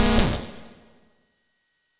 Instrument samples > Synths / Electronic
Benjolon 1 shot14
1SHOT, BENJOLIN, CHIRP, DIY, DRUM, ELECTRONICS, NOISE, SYNTH